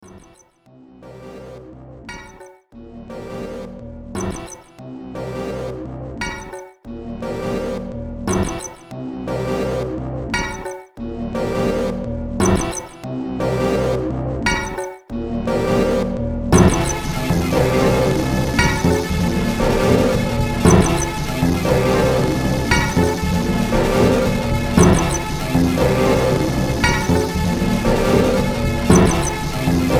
Music > Multiple instruments
Demo Track #3609 (Industraumatic)
Ambient, Cyberpunk, Games, Horror, Industrial, Noise, Sci-fi, Soundtrack, Underground